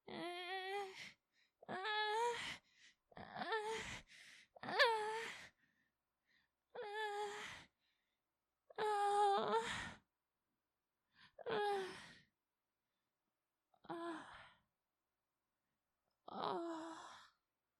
Speech > Other
Trans Girl Moaning and Finishing
authentic moaning from me finishing
female, erotic, vocal, hot, moan, sexy, moaning, voice, moans